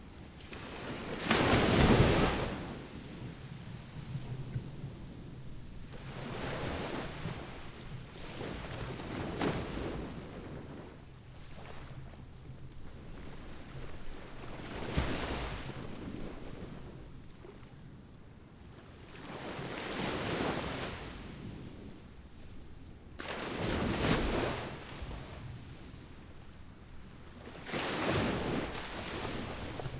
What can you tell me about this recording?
Soundscapes > Nature
WATRSurf-Gulf of Mexico Early Morning Beach Shoreline, strong surf, breaking waves, gulls, 630AM QCF Gulf Shores Alabama Zoom H3VR
Early morning beach shoreline, medium surf, waves crashing.
beach, ocean, shore, surf, water, waves